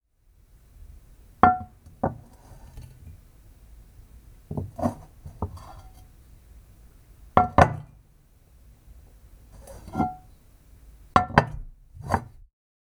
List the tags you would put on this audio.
Sound effects > Objects / House appliances
Effects
Foley
Freebie
Handling
Recording
SFX
Sound

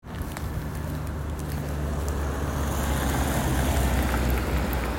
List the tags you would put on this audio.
Sound effects > Vehicles
field-recording
auto
city
street
car
traffic

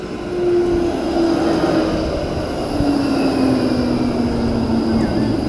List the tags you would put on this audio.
Sound effects > Vehicles
transportation
vehicle
tram

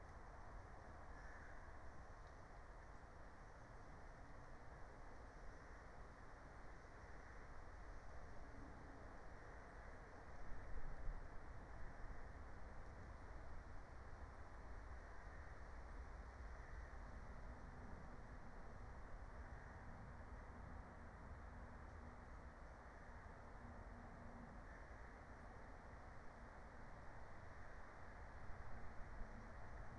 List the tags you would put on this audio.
Soundscapes > Nature
field-recording
meadow

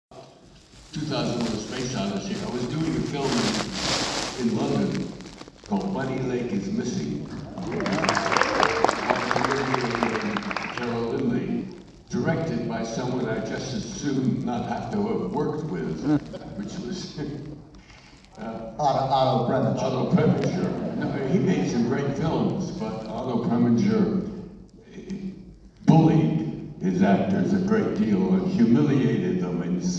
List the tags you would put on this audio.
Speech > Conversation / Crowd
2001
Interview
star

